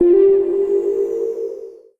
Sound effects > Electronic / Design
XD Dome
A cool ringtone/chime, made on a Minilogue XD, processed in Pro Tools.
beep, bleep, blip, chirp, click, computer, digital, effect, electronic, game, gui, Korg, Minilogue-XD, processed, ringtone, sfx, synth, ui